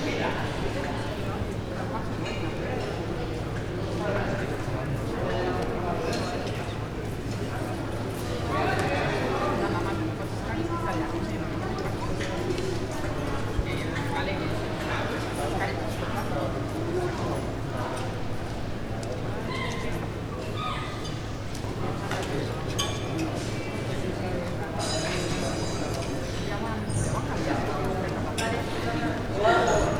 Sound effects > Human sounds and actions
20250326 MercatSantAndreu2 Humans Commerce Quiet Nice
Quiet, Nice, Humans, Commerce